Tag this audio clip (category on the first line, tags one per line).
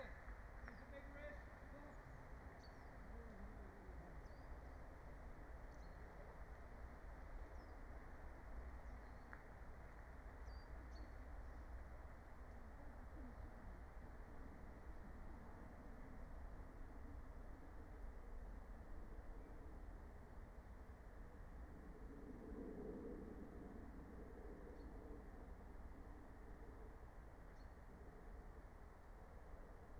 Soundscapes > Nature
soundscape
raspberry-pi
natural-soundscape
alice-holt-forest
nature
phenological-recording
field-recording
meadow